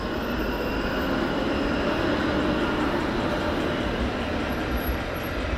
Sound effects > Vehicles
Tram 2025-10-27 klo 20.13.01
Tram, Finland, Public-transport